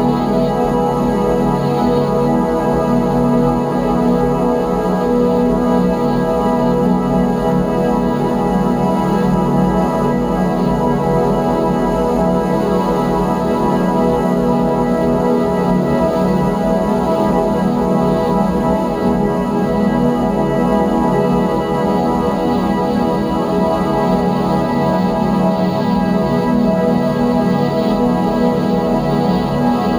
Sound effects > Experimental
"In his eyes she was the most beautiful woman in whole world. He could see that now." For this sound I recorded ambient noises in my home using a Zoom H4N multitrack recorder. I then added effects, layering and other mutations to the original files using Audacity. Ultimately producing this final file.